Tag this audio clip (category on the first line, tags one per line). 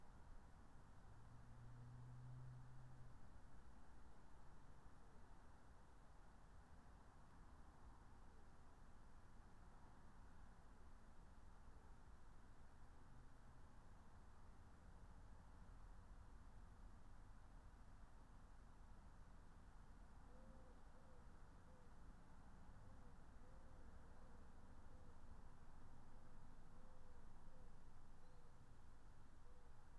Soundscapes > Nature
soundscape
nature
alice-holt-forest
phenological-recording
meadow
raspberry-pi
natural-soundscape
field-recording